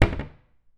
Sound effects > Objects / House appliances
Door closing
closing, door, kitchen, wooden